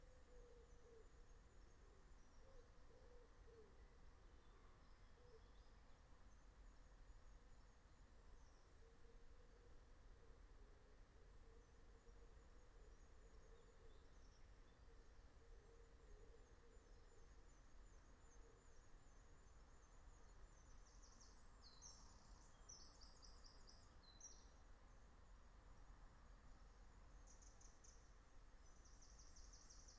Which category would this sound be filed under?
Soundscapes > Nature